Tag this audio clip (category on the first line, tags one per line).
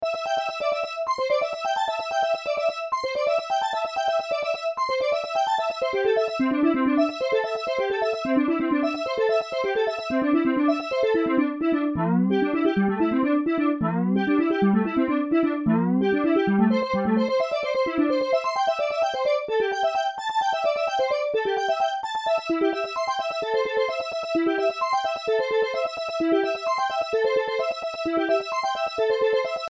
Music > Multiple instruments
beach
cheerful
fast
happy
island
joy
music
relaxing
sea